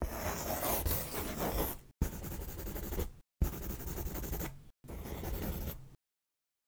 Sound effects > Objects / House appliances
Pencil scribble short
Pencil scribbles/draws/writes/strokes for a short amount of time.
draw, pencil, write